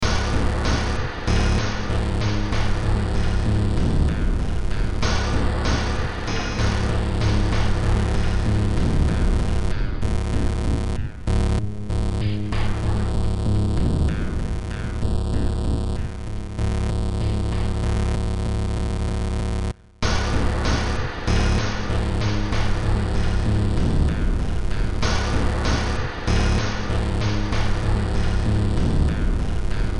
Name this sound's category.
Music > Multiple instruments